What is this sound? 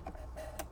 Sound effects > Objects / House appliances
OBJMisc-Blue Snowball Microphone Microscope, Focus Change Nicholas Judy TDC
A microscope focus change.
Blue-brand, Blue-Snowball, focus